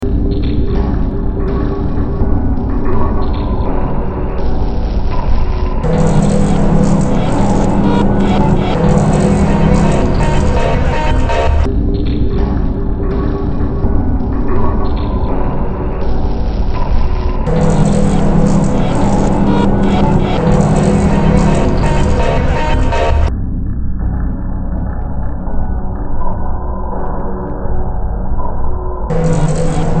Music > Multiple instruments
Demo Track #3230 (Industraumatic)
Horror, Soundtrack, Sci-fi, Ambient, Industrial, Cyberpunk, Noise, Games, Underground